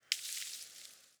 Sound effects > Natural elements and explosions
Hitting a dry bush with a stick recorded with a Rode NTG-3. Sounds almost like a splash of water.